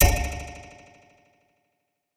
Sound effects > Experimental
Zero-G Racquet Hit 7
A failed attempt to make some other material led to the creation of these satisfying impact sounds. I imagined a blisteringly fast, zero-gravity sports game where athletes wield electric racquets/bats and hit floating spheres. (Or something else if you prefer.) Fun fact: The samples I put various through filters here were recordings of me playing a tiny kalimba.